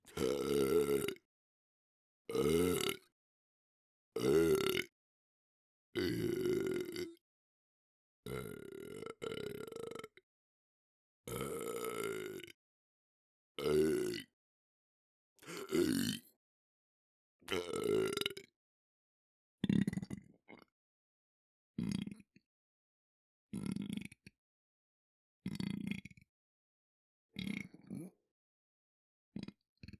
Sound effects > Other
Burps - Open Mouth & Closed
We're seeking contributors!
blurp, disgusting, burp